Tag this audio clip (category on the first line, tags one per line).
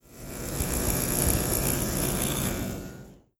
Sound effects > Objects / House appliances
razor
shave